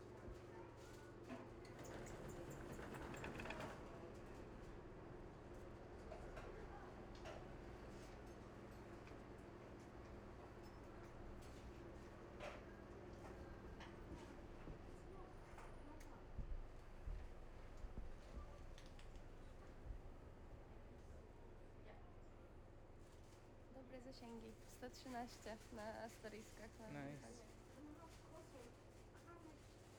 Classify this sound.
Soundscapes > Indoors